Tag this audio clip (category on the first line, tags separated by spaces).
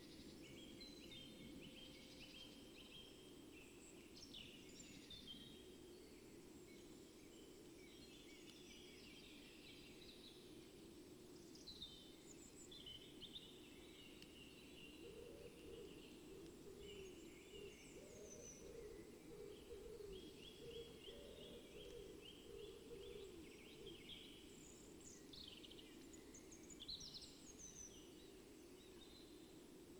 Soundscapes > Nature
weather-data modified-soundscape sound-installation nature data-to-sound soundscape Dendrophone raspberry-pi natural-soundscape artistic-intervention field-recording phenological-recording alice-holt-forest